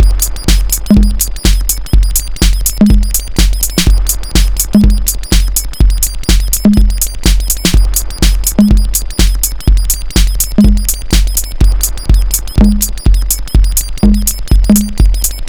Music > Multiple instruments
Made in FL11